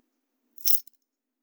Sound effects > Human sounds and actions
Single Spur Step
the sound of keys and other small metal objects inside of a beanie being jostled to create the sound of a set of spurs on cowboy boots